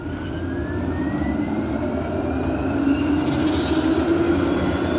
Sound effects > Vehicles
Tram sound in Tampere Hervanta Finland